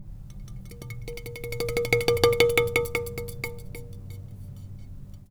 Music > Solo instrument
Marimba Loose Keys Notes Tones and Vibrations 2
marimba, woodblock, tink, rustle, thud, loose, foley, percussion, wood, keys, oneshotes, fx, notes, block, perc